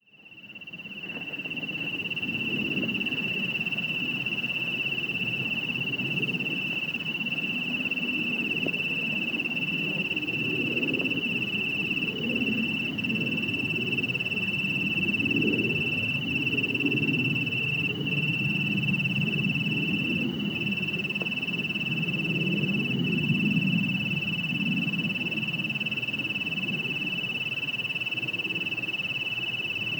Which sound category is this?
Soundscapes > Nature